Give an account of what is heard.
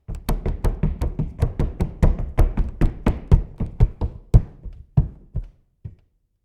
Sound effects > Human sounds and actions
Heavy footsteps on wooden floor with some ambience.